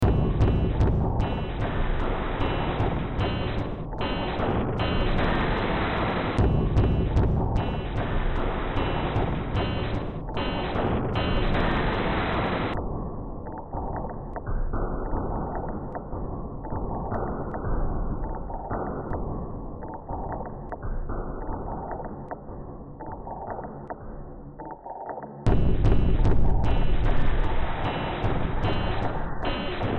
Music > Multiple instruments
Demo Track #4045 (Industraumatic)
Ambient, Cyberpunk, Horror, Noise